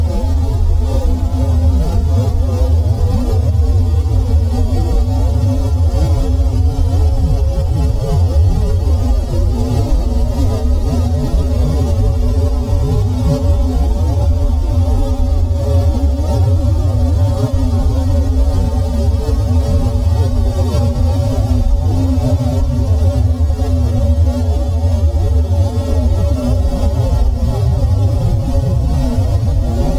Sound effects > Experimental
"Within the device's aura I perceived an entire universe of colors and sounds." I sampled sounds from my apartment and produced this sound using Audacity.
oscillation, strange, pitches, muted